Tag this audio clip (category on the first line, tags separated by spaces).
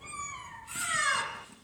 Sound effects > Animals
ringtail tail ring ringtailedlemur madagascar zoo primate lemur